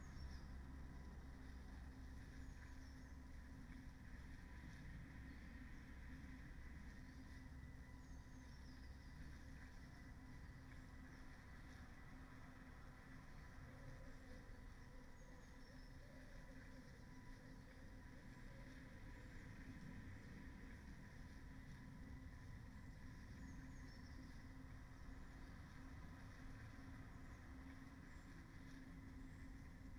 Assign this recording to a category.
Soundscapes > Nature